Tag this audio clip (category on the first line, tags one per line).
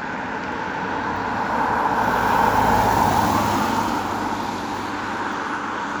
Soundscapes > Urban
Car
Drive-by
field-recording